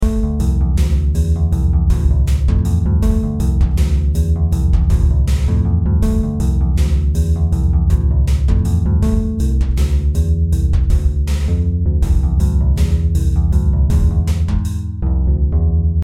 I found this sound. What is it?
Music > Multiple instruments
Jazzy Cats
A short tune that encapsulates the energy of a comfy jazzy place. Created in BeepBox.
jazz, melody, peaceful, tune